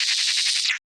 Sound effects > Electronic / Design
UNIQUE HARSH SERVER STATIC
BEEP, BOOP, CHIPPY, CIRCUIT, COMPUTER, DING, ELECTRONIC, EXPERIMENTAL, HARSH, HIT, INNOVATIVE, OBSCURE, SHARP, SYNTHETIC, UNIQUE